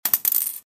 Sound effects > Objects / House appliances
Coin drop. This sound was recorded by me using a Zoom H1 portable voice recorder.